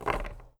Sound effects > Objects / House appliances
WOODImpt-Blue Snowball Microphone, CU Small Pile of Twigs, Drop to Floor Nicholas Judy TDC
A small pile of twigs dropping to the floor.
drop, small, twigs, floor, foley, Blue-brand